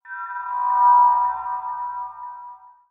Sound effects > Electronic / Design
Empty Space Desert
A bit of a freaky lil transition sfx sound. Great for game-over sound effects, or transition sounds.
fx, sound-effect, strange, freaky, space, sci-fi, ui, sounddesign, future, sfx, abstract, weird, stinger